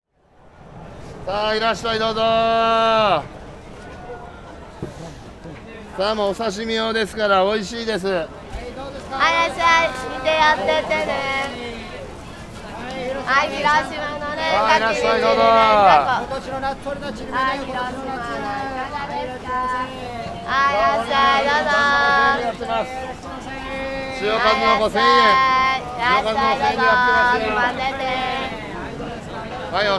Soundscapes > Urban
Ueno fishmarket (Tokyo)
Recorded in Ueno Fishmarket Tokyo 2015. With recorder Sony PCM D50 <3.
ambience, ambient, field-recording, fishmarket, japan, lively, market, people, taiko, tokyo, ueno